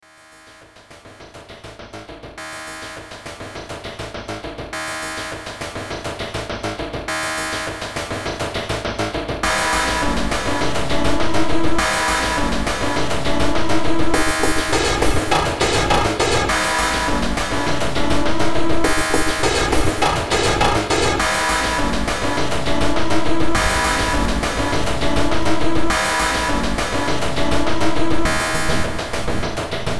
Music > Multiple instruments
Demo Track #3512 (Industraumatic)
Noise, Cyberpunk, Horror, Ambient, Games, Soundtrack, Underground, Sci-fi, Industrial